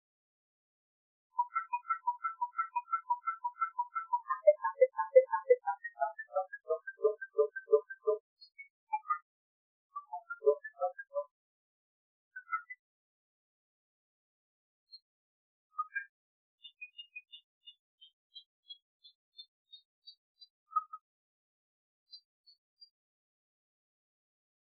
Music > Solo percussion
Simple Bass Drum and Snare Pattern with Weirdness Added 050
Bass-and-Snare, Bass-Drum, Experimental, Experimental-Production, Experiments-on-Drum-Beats, Experiments-on-Drum-Patterns, Four-Over-Four-Pattern, Fun, FX-Drum, FX-Drum-Pattern, FX-Drums, FX-Laden, FX-Laden-Simple-Drum-Pattern, Glitchy, Interesting-Results, Noisy, Silly, Simple-Drum-Pattern, Snare-Drum